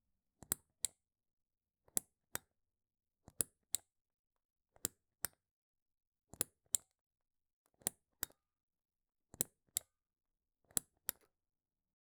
Sound effects > Objects / House appliances
Foley recording of a household floor lamp foot switch. Single, short-duration click with clean transient, low handling noise
closeup, sfx, lamp, off, footswitch, floorlamp, light, mechanical, plastic, switch, toggle, press, foley, click, household